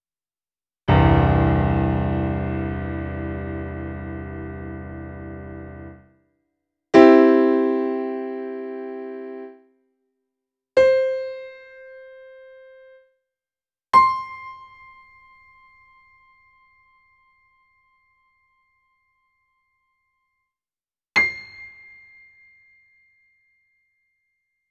Instrument samples > Piano / Keyboard instruments
Yamaha epiano multiple C tones non-broken
Yamaha electric piano, all not broken C with short sustain.
Yamaha, keyboard, epiano, Instruments